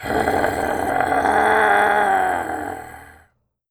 Sound effects > Animals
TOONAnml-Blue Snowball Microphone Wild Animal Growl, Human Imitation, Cartoon Nicholas Judy TDC
A wild animal growling. Human imitation. Cartoon.
animal, Blue-brand, Blue-Snowball, cartoon, growl, human, imitation, wild